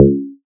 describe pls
Instrument samples > Synths / Electronic
FATPLUCK 2 Db
additive-synthesis, fm-synthesis